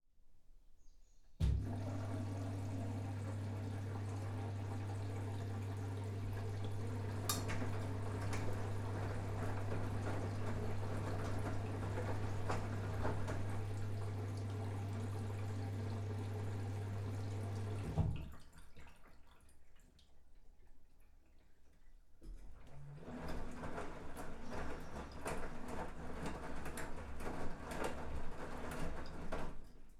Soundscapes > Indoors
MACHAppl tcl washingmachine eofcycle with beep and birds EM272Z1
A TCL washing machine where, at the end of the cycle, a beep sounds accompanied by bird noises since I forgot to close the window.